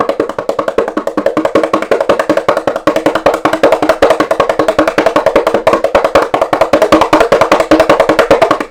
Music > Solo percussion
A bongo run.